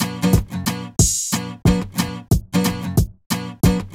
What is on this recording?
Instrument samples > String
GUITAR LOOP WITH KICK
it's just a simple looped guitar
kick, bass, ratio, guitar, beat, hard, test, chord, loop, signal, pythagorean, drum